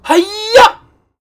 Sound effects > Human sounds and actions
VOXScrm-Blue Snowball Microphone, CU Yell, Karate, Hiya! Nicholas Judy TDC
A karate yell. 'Hiya!'.
karate, Blue-brand